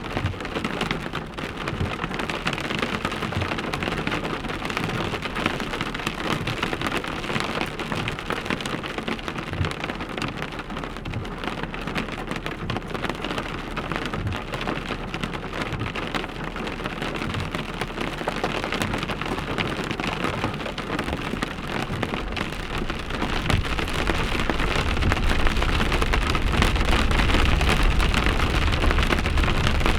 Soundscapes > Nature
Driving in Rain & Hail Wipers
Sound of rain and hail from inside a minivan while driving. Shower stops at end of recording. Sound of wiper blades. Zoom H4N Silver Jack, Colorado
hail, weather